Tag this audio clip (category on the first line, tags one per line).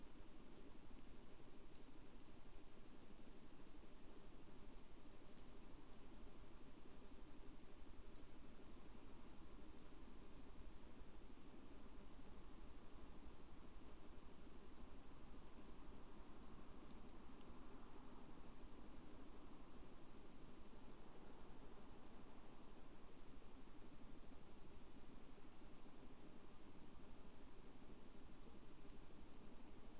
Soundscapes > Nature
Dendrophone
field-recording
soundscape
phenological-recording
nature
sound-installation
natural-soundscape
artistic-intervention
alice-holt-forest
weather-data
raspberry-pi
data-to-sound
modified-soundscape